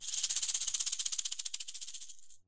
Instrument samples > Percussion
Two shakers assembled by a wood handle were played to achieve some different dual-shaker transitions.